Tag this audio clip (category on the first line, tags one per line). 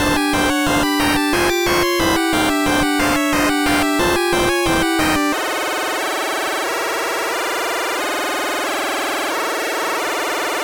Sound effects > Electronic / Design
8-bit
Alarm
Beep
Chiptune
Warning